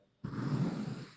Sound effects > Objects / House appliances
Drag - Dragging sound LOOPABLE
Recorded with Samsung S24 This sound is actually me moving my finger on my mousepad! LOOPABLE LOOP
dragging, drag, asmr, soft